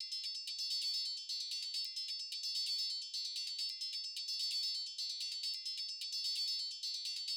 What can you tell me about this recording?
Music > Solo instrument
guitar high arpeggiator in F
a sound made with a guitar